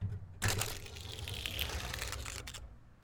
Sound effects > Objects / House appliances
Fence opening. Recorded using a Zoom H6 up close to the fence.